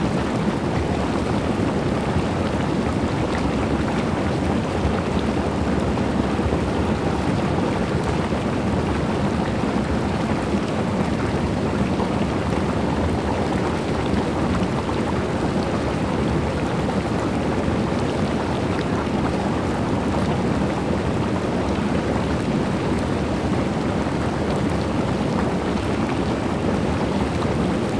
Soundscapes > Nature
Ambiance Hot Spring Furnas Caldeiras Loop Stereo 03
Hot Spring - Close/Medium Recording - Loop Recorded at Furnas (Caldeiras), São Miguel. Gear: Sony PCM D100.
ambience, azores, boiling, bubbling, bubblingwater, caldeiras, environmental, fieldrecording, furnas, geothermal, hissing, hotspring, hotsteam, loop, natural, nature, outdoor, portugal, relaxation, saomiguel, soundscape, steam, stereo, thermal, vapor, water